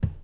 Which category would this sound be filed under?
Sound effects > Objects / House appliances